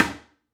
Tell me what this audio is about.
Instrument samples > Percussion
Bucket Drum 2
A hit of a drum made from a bucket with clear wrap as the skin. Recorded 8/7/25 with a Zoom H4Essential.
percussive, percussion, hit, drum